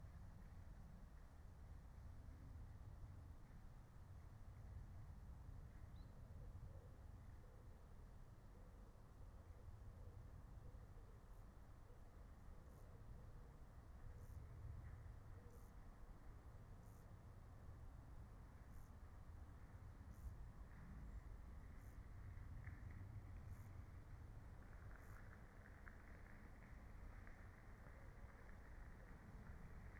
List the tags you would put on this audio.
Soundscapes > Nature
soundscape natural-soundscape raspberry-pi field-recording alice-holt-forest meadow phenological-recording nature

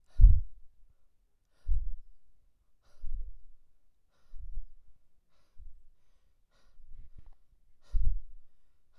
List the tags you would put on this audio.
Speech > Other
experimental breathing noise

